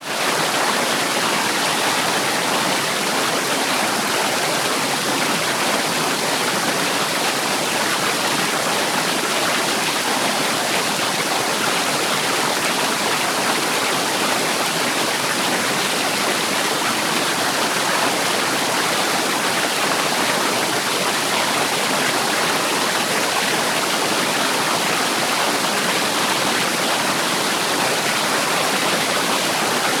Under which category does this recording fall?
Soundscapes > Nature